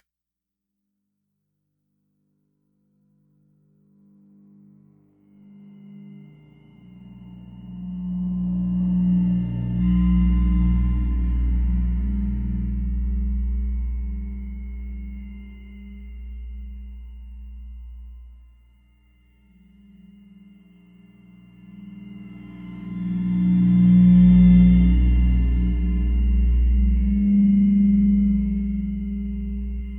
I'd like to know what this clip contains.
Music > Multiple instruments
Atonal Ambient Texture #003 - Doubtful Future
Experiments on atonal melodies that can be used as background ambient textures. AI Software: Suno Prompt: atonal, non-melodic, low tones, reverb, background, ambient, noise
pad, soundscape, ai-generated, experimental, atonal, ambient, texture